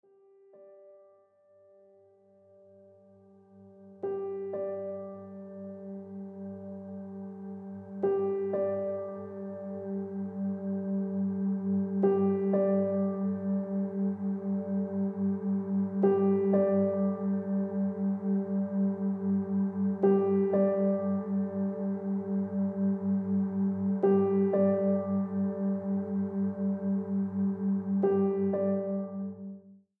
Music > Solo instrument
Motive - Horror Atmosphere (Preview)
A dark and brooding piano track with criminal undertones. Great for crime documentaries, shadowy cityscapes, or intense narrative scenes.
cinematic, city, crime, film